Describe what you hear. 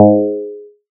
Instrument samples > Synths / Electronic
FATPLUCK 1 Ab
additive-synthesis, bass, fm-synthesis